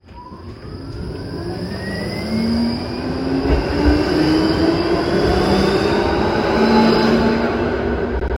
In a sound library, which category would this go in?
Soundscapes > Urban